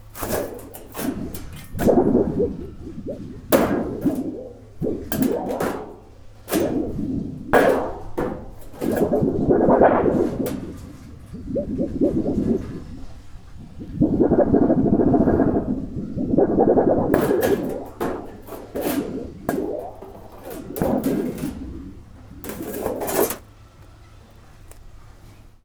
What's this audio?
Sound effects > Objects / House appliances
Junkyard Foley and FX Percs (Metal, Clanks, Scrapes, Bangs, Scrap, and Machines) 198
tube, Foley, Atmosphere, Ambience, SFX, Metallic, rattle, Robot, Junkyard, trash, Perc, Environment, FX, Bash, dumping, dumpster, Robotic, Junk, waste, Machine, rubbish, garbage, Percussion, Smash